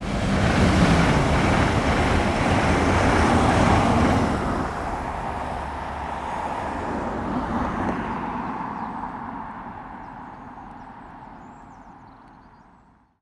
Soundscapes > Nature
A recording of a train and cars passing by near a bridge.
ambience, Field, recording, residential, traffic, train